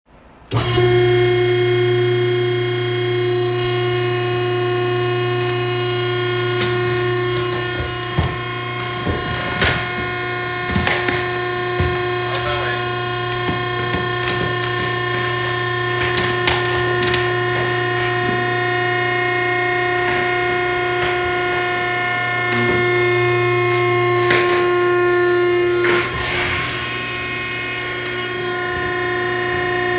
Soundscapes > Indoors
recorded the baler with my iPhone again at work. Who knows, maybe I'll do a 6th.
baler, box, cardboard, crush, crushing, factory, industrial, machine, machiner, machinery, mechanical